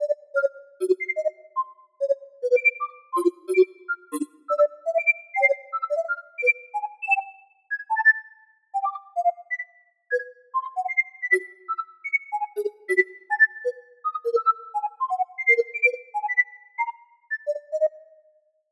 Sound effects > Electronic / Design
Digital keypad tones (light)
Digital keypad sounds, light tone, created in Cakewalk using a synth pad.
sci-fi, digital, keypad, number, dial